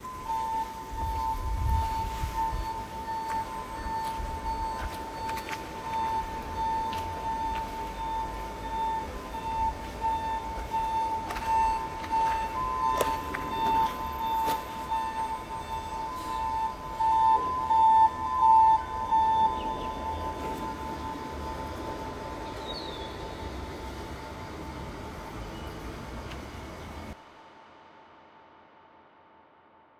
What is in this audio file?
Sound effects > Vehicles
alarm in the abandoned city
An explorer walks through an abandoned city while an alarm or signal sounds out of an empty building. The explorer stops and listens to the alarm. After the alarm stops, a bird chirps cautiously over the rising wind. Recorded with an iPhone and enhanced with BandLab. Originally a recording of a garbage truck backing up and then driving away.